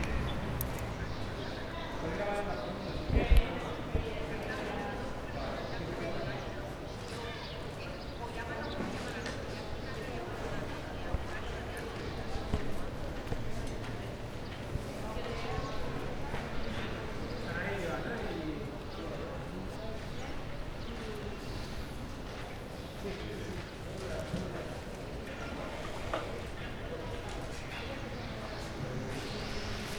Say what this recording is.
Sound effects > Human sounds and actions
20250326 CarrerdeSantAdria Humans Traffic Construction Monotonous
Urban Ambience Recording in collab with Martí i Pous High School, Barcelona, March 2025, in the context of a sound safari to obtain sound objects for a sound narrative workshop. Using a Zoom H-1 Recorder.
Construction, Humans, Monotonous, Traffic